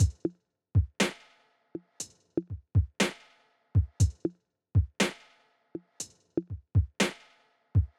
Instrument samples > Percussion
lazy industrial drum loop (120bpm)
All the free wavs in this pack are Ableton drum racks that I've cut into loops and exported from old beats that never left my computer. They were arranged years ago in my late teens, when I first switched to Ableton to make hip-hop/trap and didn't know what I was doing. They are either unmixed or too mixed with reverb built in. Maybe I'm being too harsh on them. I recommend 'amen breaking' them and turning them into something else, tearing them apart for a grungy mix or layering to inspire pattern ideas. That's what I love doing with them myself.
drum, sample, percs, loop, drums